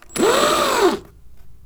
Sound effects > Other mechanisms, engines, machines
Milwaukee impact driver foley-002
Drill, Foley, fx, Household, Impact, Mechanical, Metallic, Motor, Scrape, sfx, Shop, Tool, Tools, Woodshop, Workshop